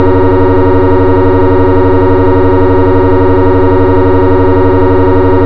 Sound effects > Other mechanisms, engines, machines
This sound is great for train simulators!